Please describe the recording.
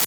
Instrument samples > Synths / Electronic
A databent closed hihat sound, altered using Notepad++